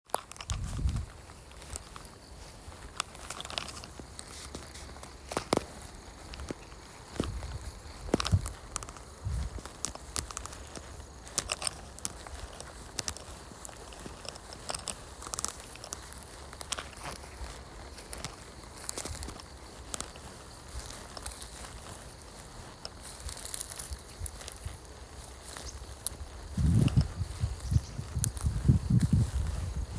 Soundscapes > Nature
walking in forest
Strolling in forest with recording by iphone.